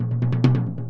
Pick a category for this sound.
Music > Solo percussion